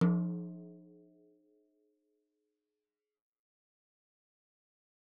Music > Solo percussion
Hi Tom- Oneshots - 26- 10 inch by 8 inch Sonor Force 3007 Maple Rack
roll, beats, fill, studio, tom, hitom, oneshot, acoustic, velocity, drum, beat, instrument, rimshot, hi-tom, beatloop, tomdrum, perc, drums, percs, kit, percussion, flam, drumkit, rim, toms